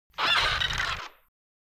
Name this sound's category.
Sound effects > Animals